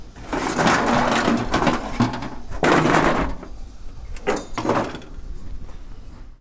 Sound effects > Objects / House appliances
Junkyard Foley and FX Percs (Metal, Clanks, Scrapes, Bangs, Scrap, and Machines) 134
Foley, Junk, scrape, garbage, Environment, dumping, Junkyard, Clank, SFX, Bang, rubbish, FX, dumpster, Perc, Metallic, Robot, trash, rattle, tube, Metal, Ambience, Machine, Dump, Atmosphere, Robotic, Clang, waste, Smash, Bash, Percussion